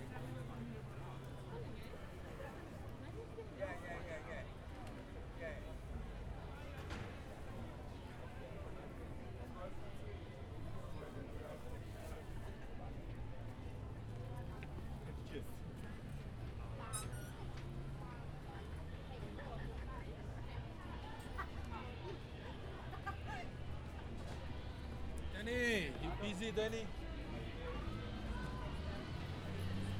Soundscapes > Urban
Camden Town, London, exterior market, clothing stall, early afternoon, April 2011
Early afternoon crowd, an outdoor clothing stall in Camden Town, London, April 2011. Passing chatter in different languages, occasional background music, street sounds, market activity.
activity, crowd, diverse, London, market, music, people, street